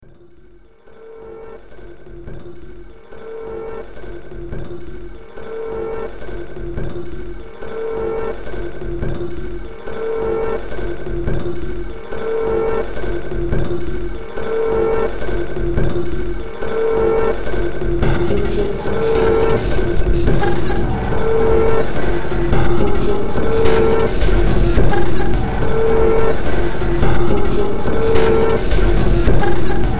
Music > Multiple instruments
Demo Track #3042 (Industraumatic)
Ambient, Cyberpunk, Games, Horror, Industrial, Noise, Sci-fi, Soundtrack, Underground